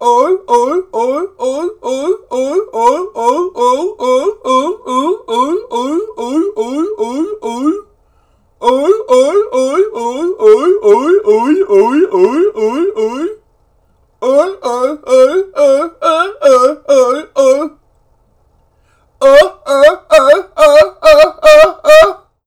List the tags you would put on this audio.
Sound effects > Animals
Blue-brand cartoon seal Blue-Snowball human imitation bark

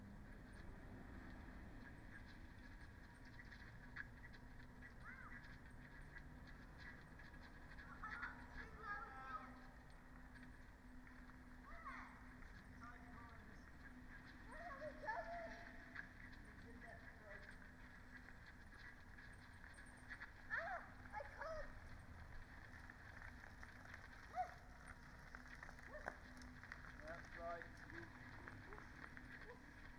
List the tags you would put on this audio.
Soundscapes > Nature
natural-soundscape weather-data soundscape raspberry-pi modified-soundscape artistic-intervention nature sound-installation data-to-sound phenological-recording field-recording Dendrophone alice-holt-forest

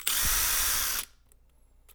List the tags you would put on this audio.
Sound effects > Objects / House appliances
clunk fieldrecording foley foundobject glass hit metal natural stab

